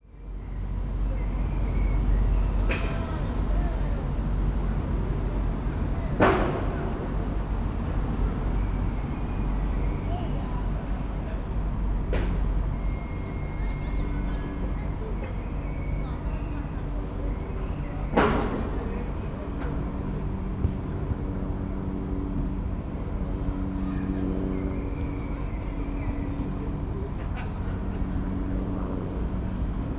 Soundscapes > Urban
A recording from Bloomsbury Square gardens, London. Day time.